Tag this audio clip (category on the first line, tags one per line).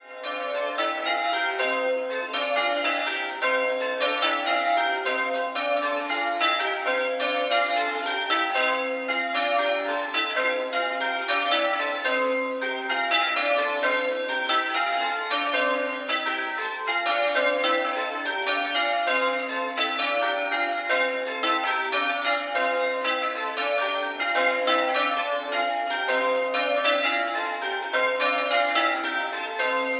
Soundscapes > Urban
outdoor
field-recording
church
ringing
bells